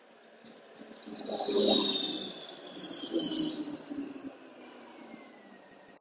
Sound effects > Vehicles
Tram Sound
15, mics, light, iPhone, Pro, Tram, city, rain